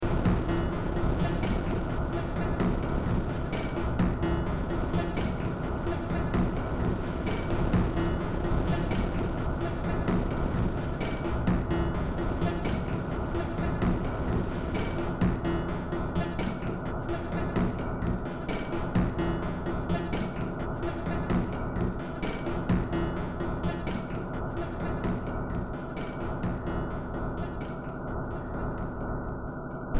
Music > Multiple instruments
Demo Track #3641 (Industraumatic)
Ambient, Cyberpunk, Games, Horror, Industrial, Noise, Sci-fi, Soundtrack, Underground